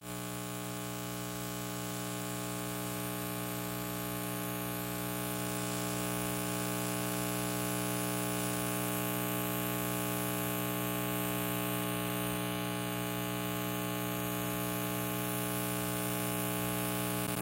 Sound effects > Objects / House appliances
Digital Static from TV
Digital static sound recorded upclose to a tv speaker on my iPhone 15 pro max.
noise, TV, fuzz, static